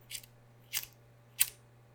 Sound effects > Other
LIGHTER FLICK 18
flick, lighter, zippo